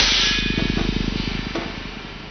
Sound effects > Electronic / Design
Impact Percs with Bass and fx-001
hit, deep, ominous, impact, explosion, theatrical, bash, foreboding, explode, brooding, percussion, looming, bass, combination, sfx, perc, oneshot, low, fx, crunch, smash, cinamatic, mulit